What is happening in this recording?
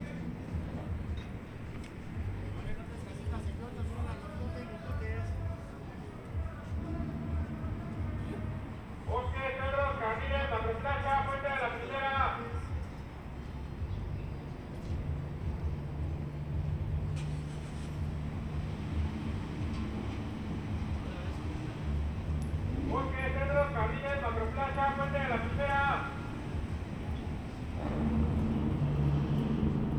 Soundscapes > Urban
Ambience Mexico City Indios verdes paradero night Take 3
Ambience in Mexico City at Indios verdes subway station 11 pm.
Mexico-City, Perifoneo, Anuncio, Metro, CDMX, night, noche, indios, df, Ambience, Mexico, verdes, paradero